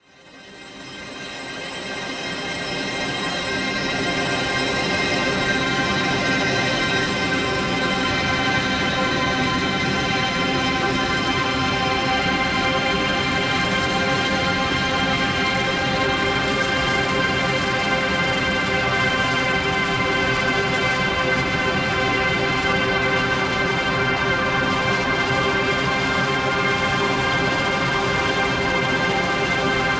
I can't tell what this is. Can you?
Soundscapes > Synthetic / Artificial
I mangled some sounds from my library in Forester and recorded a file, which i then opened in 2MGT's Elumia and recorded this.